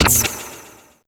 Sound effects > Electronic / Design
SFX Spell WhisperedShort-01
A short, intense whisper - just what did they just cast? Variation 1 of 4.